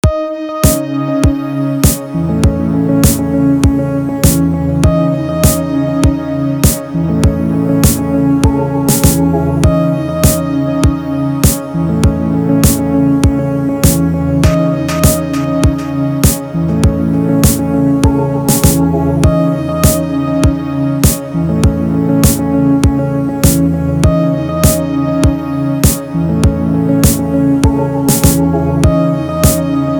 Music > Multiple instruments
Ableton Live. VST....Purity.....Atmospheric Free Music Slap House Dance EDM Loop Electro Clap Drums Kick Drum Snare Bass Dance Club Psytrance Drumroll Trance Sample .
Drums, Kick, Clap, Free, Loop, Slap, Electro, House, EDM, Snare, Music, Bass, Atmospheric, Dance, Drum